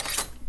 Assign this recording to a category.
Sound effects > Objects / House appliances